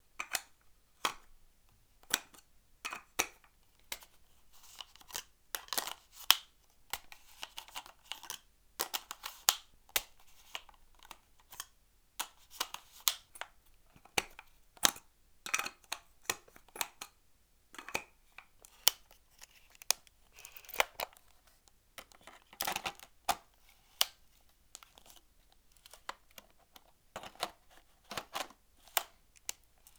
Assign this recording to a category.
Sound effects > Objects / House appliances